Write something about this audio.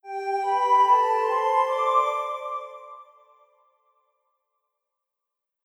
Sound effects > Electronic / Design
Angelic Fanfare 3
Simple celebratory melody made with FL Studio / Kontakt / Vocalise.
ambiance, angel, angelic, blissful, celebration, celebratory, cheer, cheerful, choir, cinematic, divine, ethereal, fanfare, fantasy, good, harmony, heavenly, holy, majestic, melody, opener, orchestral, radiant, reveille, sound, sound-effect, triumphant, uplifting, vocal